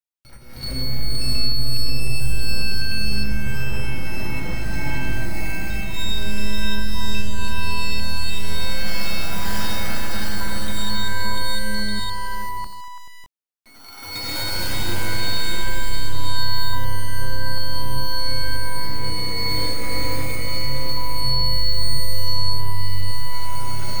Soundscapes > Synthetic / Artificial
Trickle Down The Grain 7
packs, electronic, soundscapes, samples, free, granulator, glitch, sfx, experimental, sample, sound-effects, noise